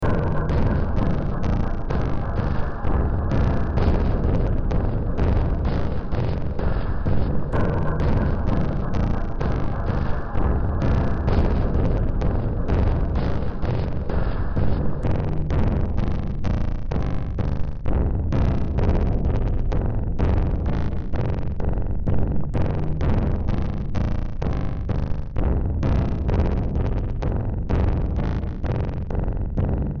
Music > Multiple instruments
Demo Track #3862 (Industraumatic)
Ambient, Cyberpunk, Games, Horror, Industrial, Noise, Sci-fi, Soundtrack, Underground